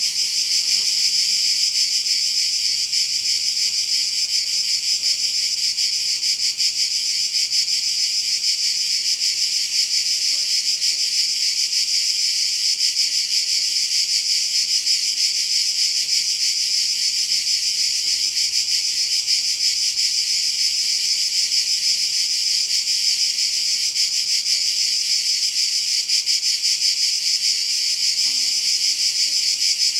Soundscapes > Nature
Cicadas on a Hot Afternoon in the Gorge
A soundscape of cicadas on a hot afternoon in Les Gorges de la Nesque, Provence, France. Recorded using a pair of Primo EM258s attached to the sides of my backpack (acting as a baffle). Various other flying insects buzz close to the microphones from time to time. File will loop smoothly.
summer, insects